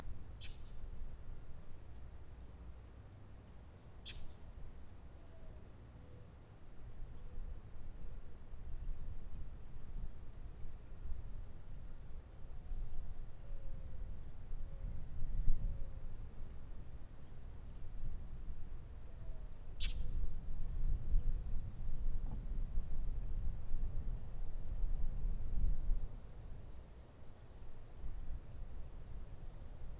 Soundscapes > Urban
AMBSea-Summer Predawn gravel road alongside lagoon, crickets, owl, birdsong, distant traffic 6AM QCF Gulf Shores Alabama Zoom H3VR
Pre-dawn on a Gravel Road near Little Lagoon, Gulf Shores, Alabama. Owls, birds, crickets, wind, distant traffic